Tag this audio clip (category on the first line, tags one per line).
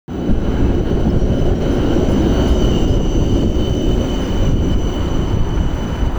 Sound effects > Vehicles
rail
tram
vehicle